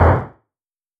Sound effects > Human sounds and actions
Footstep Gravel Running-04
Shoes on gravel, running. Lo-fi. Foley emulation using wavetable synthesis.
footstep, gravel, jog, jogging, lofi, run, running, steps, synth